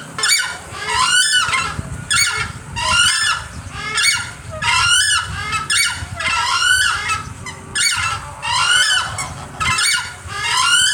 Sound effects > Animals
Waterfowl - Southern Screamers
Recorded with an LG Stylus 2022. These are screamers, close relatives of ducks and geese, and they're from South America.
bird, fowl, jungle, screamer, south-america, southern-screamer, waterfowl